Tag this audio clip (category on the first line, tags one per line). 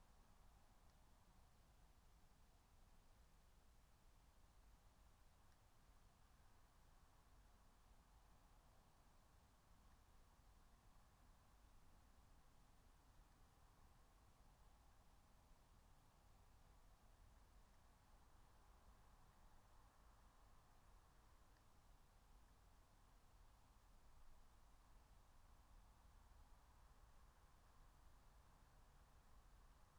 Soundscapes > Nature
phenological-recording
nature
raspberry-pi
alice-holt-forest
natural-soundscape
field-recording
soundscape
meadow